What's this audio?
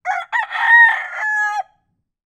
Sound effects > Animals
Rooster. (Take 1) Recorded in the surroundings of Santa Rosa (Baco, Oriental Mindoro, Philippines)during August 2025, with a Zoom H5studio (built-in XY microphones). Fade in/out applied in Audacity.